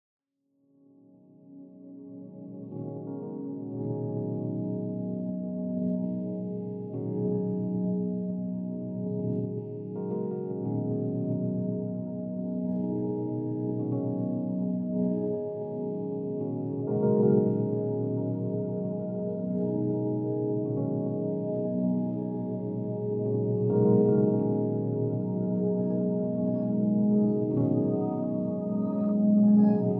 Music > Other
Why do I keep trying to pretend things are ok?
rhodes, glitch, lofi, granular, loop, ambient